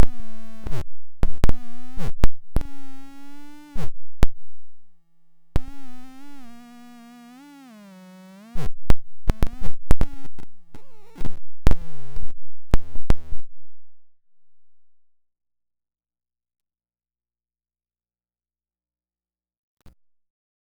Sound effects > Electronic / Design
Optical Theremin 6 Osc dry-117
Alien, Noise, Infiltrator, Instrument, Synth, DIY, Experimental